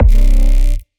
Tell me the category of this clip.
Instrument samples > Synths / Electronic